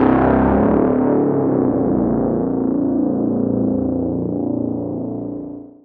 Instrument samples > Synths / Electronic
CVLT BASS 70
bass, lfo, sub, stabs, bassdrop, drops, synthbass, wobble, wavetable, synth, subbass, subs, subwoofer, lowend, clear, low